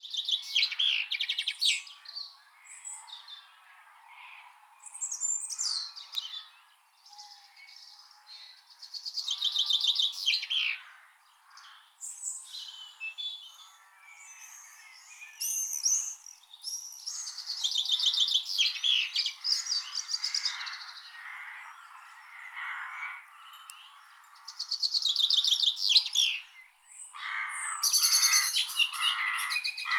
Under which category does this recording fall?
Soundscapes > Nature